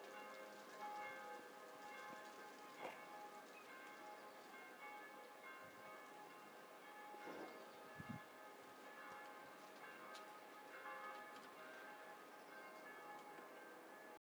Soundscapes > Urban
Distant church bells
Stereo recording of far away church bells in city
Bells, Church, Day